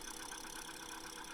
Sound effects > Other mechanisms, engines, machines
Cassette Tape Machine Fast Forward Loop
Recorded from a Technics M8 Cassette Deck
retro, tape, Project, movie, cinema, old, fastforward, machine, projector, vintage, technics, film, Mechanical, cassette